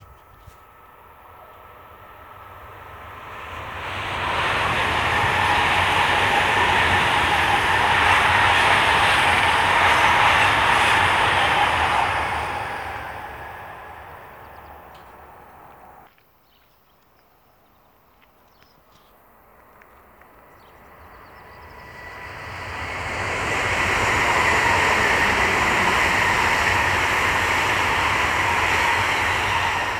Sound effects > Vehicles
German High-speed Train ICE passing sound
Two German highspeed trains type ICE 4 are passing. Recorded on a summer morning using a Rode wireless Go II sender close to Karlsruhe, Germany.
highspeed, passenger-train, railway